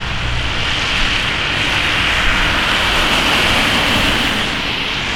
Sound effects > Vehicles
Car00063888CarMultiplePassing
drive, field-recording, rainy